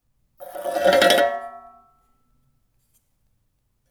Sound effects > Other mechanisms, engines, machines
Dewalt 12 inch Chop Saw foley-032
Scrape, Metal, Blade, FX, Perc, Woodshop, Metallic, Shop, Saw, Tooth, Chopsaw, Tool, Foley, Tools, Teeth, Percussion, Workshop, SFX, Circularsaw